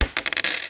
Sound effects > Objects / House appliances
OBJCoin-Samsung Galaxy Smartphone, CU Quarter, Drop, Spin 09 Nicholas Judy TDC
A quarter dropping and spinning.